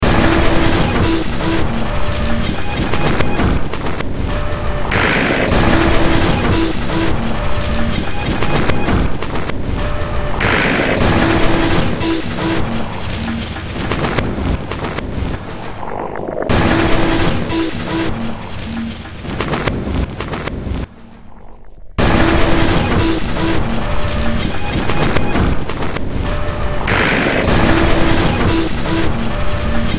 Music > Multiple instruments
Demo Track #3502 (Industraumatic)
Ambient, Cyberpunk, Games, Horror, Industrial, Noise, Sci-fi, Soundtrack, Underground